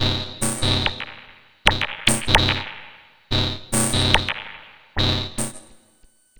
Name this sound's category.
Instrument samples > Synths / Electronic